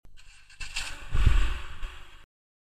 Sound effects > Vehicles
Turning on a car vehicle. Recorded with a mobile phone